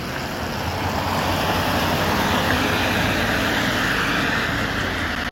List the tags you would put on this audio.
Soundscapes > Urban
car traffic vehicle

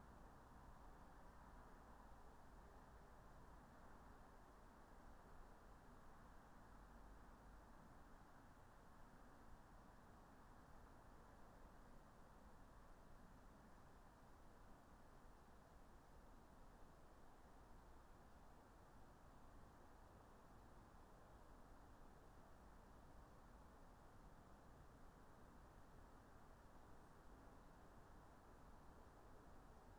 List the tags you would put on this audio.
Soundscapes > Nature
alice-holt-forest artistic-intervention raspberry-pi field-recording Dendrophone natural-soundscape data-to-sound phenological-recording modified-soundscape soundscape nature sound-installation weather-data

